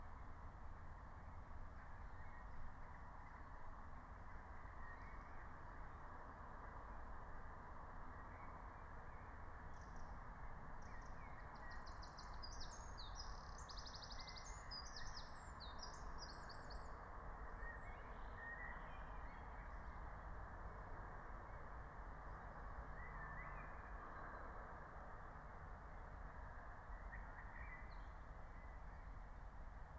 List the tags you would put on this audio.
Soundscapes > Nature
raspberry-pi meadow field-recording phenological-recording soundscape alice-holt-forest nature natural-soundscape

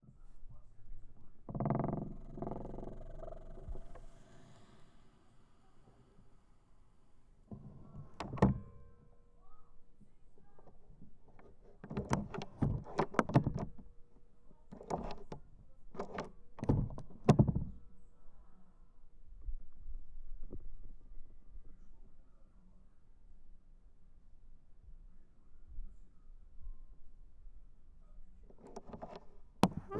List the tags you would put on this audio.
Sound effects > Other
key door lock locking keys

